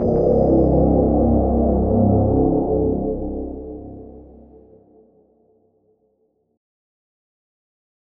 Instrument samples > Synths / Electronic
Deep Pads and Ambient Tones28
Analog, bass, bassy, Chill, Dark, Deep, Digital, Ominous, Pad, Pads, synthetic, Tone, Tones